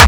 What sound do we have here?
Instrument samples > Percussion
Classic Crispy Kick 1-Punch-G#
Punch, powerkick, powerful, Distorted, Crispy, Kick, brazilianfunk